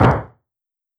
Sound effects > Human sounds and actions
Footstep Gravel Running-05
Shoes on gravel, running. Lo-fi. Foley emulation using wavetable synthesis.
run, jogging, synth